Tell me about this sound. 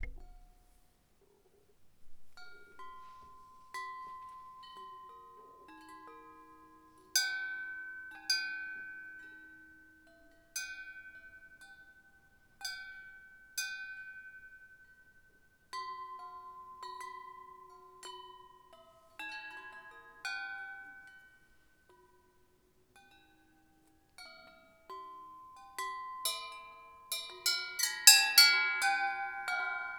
Sound effects > Objects / House appliances
Large brass windchimes
Large brass windchime with wooden striker. Recorded indoors in as neutral environment as I could get, moved randomly to simulate wind driven motion. Recorded with Tascam DR-40X